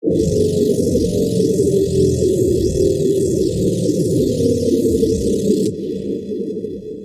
Sound effects > Electronic / Design
closing in 2 (cyberpunk ambience)
80s, cyberpunk, retro, synthwave